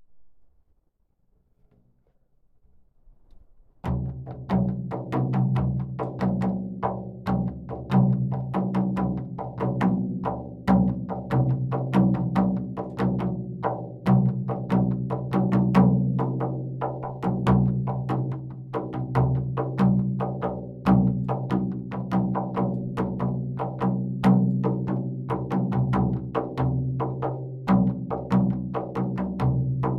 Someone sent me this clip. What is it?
Music > Solo instrument
Solo of frame drum by Schlagwerk recorded on Pixel 6 pro
drum, framedrum, percussion